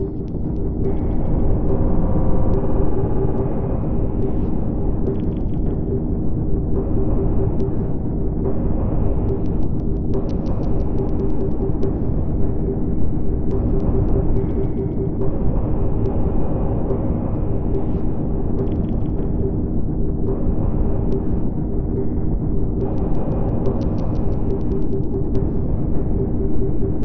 Soundscapes > Synthetic / Artificial
This 71bpm Ambient Loop is good for composing Industrial/Electronic/Ambient songs or using as soundtrack to a sci-fi/suspense/horror indie game or short film.
Drum, Packs